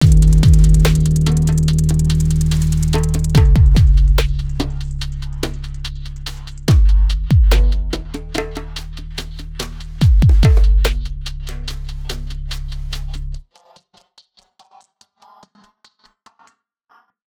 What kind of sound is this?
Music > Multiple instruments
72bpm subby bass beat loop with hand drums
A collection of electronic beats and loops with bass and other instrumentation , Hip Hop grooves, subby chops and Percussion mixed with FL Studio and a ton of effects processing, processed in reaper
Loops, Melody, Beat, Hip, HipHop, FX, Chill, Subloop, Funky, Sample, Heavy, TripHop, Beats, Melodies, Bass, Soul, IDM, Downtempo, Percussion, Trippy, Sub, EDM, Bassloop, Groove, Groovy, Loop, Hop, Perc